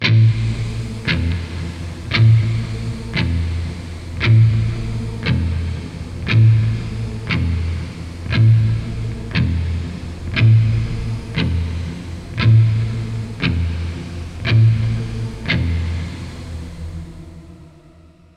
Music > Solo instrument
This was made palm muting and hitting 5th and 6th string on my guitar. A lush, atmospheric shimmer reverb effect, perfect for creating ethereal pads, dreamy soundscapes, or adding an angelic tail to lead instruments. This effect pitches up the reverb decay, generating bright, sustained harmonics that float above the original signal, producing a rich, evolving, and slightly otherworldly ambiance. Captured using AmpliTube 5's Shimmer Reverb. Ideal for ambient music, film scores, game audio, or experimental sound design.